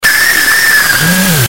Sound effects > Electronic / Design
Loud Jumpscare 7
Audio, Jumpscare, Sound